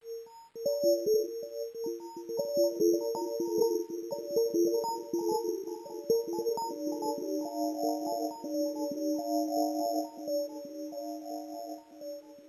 Music > Solo percussion
Pixel Bells [Loopable]
Pixel theme song 2026
relax, music, video, game, tune